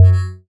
Instrument samples > Synths / Electronic
BUZZBASS 2 Gb
additive-synthesis, fm-synthesis, bass